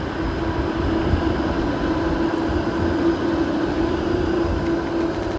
Soundscapes > Urban
Electric tram operating on metal rails. High-pitched rail friction and metallic wheel screech, combined with steady electric motor hum. Rhythmic clacking over rail joints, bell or warning tone faintly audible. Reflections of sound from surrounding buildings, creating a resonant urban atmosphere. Recorded on a city street with embedded tram tracks. Recorded on iPhone 15 in Tampere. Recorded on iPhone 15 outdoors at a tram stop on a busy urban street. Used for study project purposes.